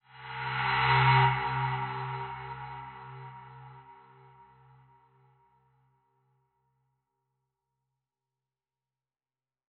Sound effects > Other
Map Area Reveal
As the fog of war fades away. Made with FL Studio.
synth, cinematic, game